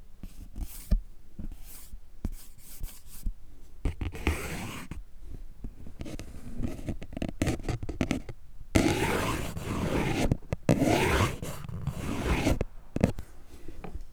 Sound effects > Objects / House appliances
Subject : A recording made for Friction series of dare, Dare2025-10 "Cardboard / Paper". Hardware : Zoom H5 XY. Flimsy recording setup on pillow or something. Weather : Processing : Trimmed in Audacity probably some slicing. Normalised.